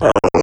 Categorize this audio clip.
Sound effects > Electronic / Design